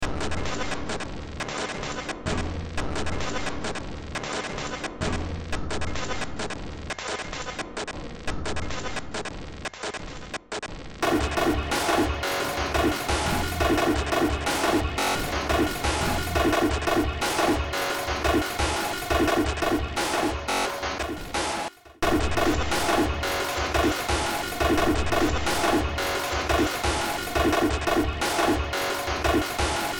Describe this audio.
Music > Multiple instruments
Short Track #3209 (Industraumatic)
Horror, Games, Ambient, Industrial, Sci-fi, Noise, Soundtrack, Underground, Cyberpunk